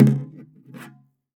Music > Solo instrument
Toms Misc Perc Hits and Rhythms-005
Custom, Drum, Drums, GONG, Hat, Oneshot